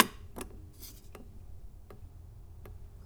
Sound effects > Other mechanisms, engines, machines
Woodshop Foley-007
bam, bang, boom, bop, crackle, foley, fx, knock, little, metal, oneshot, perc, percussion, pop, rustle, sfx, shop, sound, strike, thud, tink, tools, wood